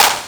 Instrument samples > Synths / Electronic
Bleepdrum-Clap 01
Sounds made with The Bleep Drum, an Arduino based lo-fi rad-fi drum machine
Hi-Hats, Snare, Kick, Analog, Lo-Fi, Drum, Electronic, Clap, Circuit-Bend, Bleep, Drums